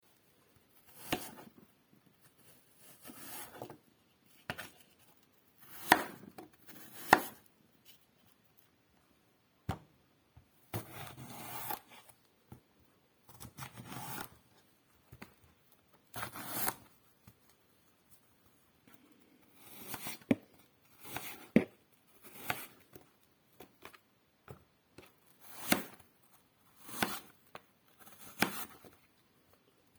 Sound effects > Objects / House appliances
Chopping an apple into wedges on a chopping board